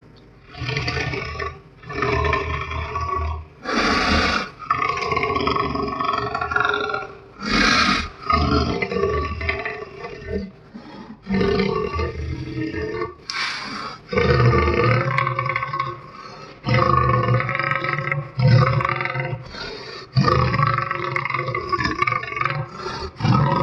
Sound effects > Animals
Recorded myself growling through a paper tube whilst said paper tube was on a empty glass of water. Imitating lions until I pitched it down to sound more monstrous.